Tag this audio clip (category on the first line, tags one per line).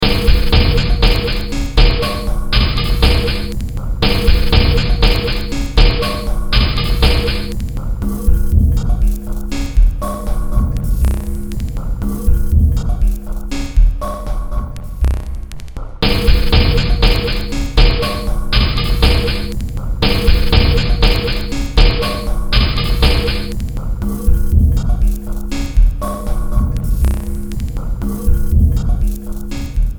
Music > Multiple instruments
Ambient
Sci-fi
Industrial
Underground
Noise
Cyberpunk
Horror
Soundtrack
Games